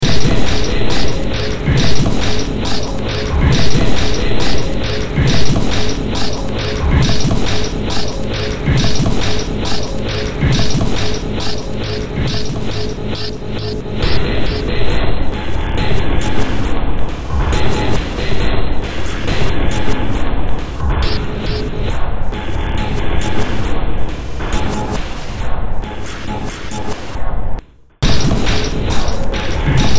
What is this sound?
Music > Multiple instruments
Short Track #2946 (Industraumatic)
Ambient, Cyberpunk, Games, Horror, Industrial, Noise, Sci-fi, Soundtrack, Underground